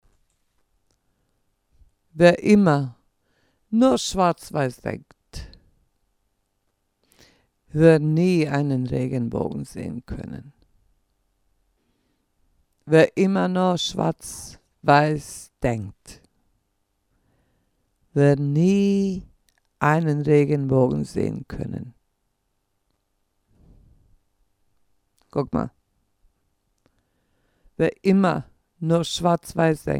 Sound effects > Human sounds and actions
Regenbogen - German language
for free. Wer immer nur schwarz-weiss denkt, wird nie einen Regenbogen sehen. (This German language).
language, talk